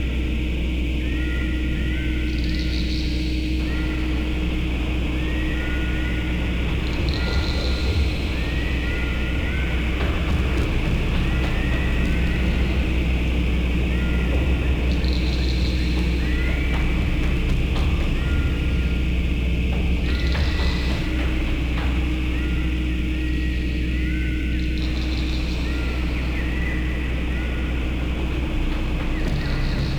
Soundscapes > Synthetic / Artificial
An experimental, looped texture designed in Reason Studios.
atmospheric, dark, distorted, eerie, evolving, loped, synthetic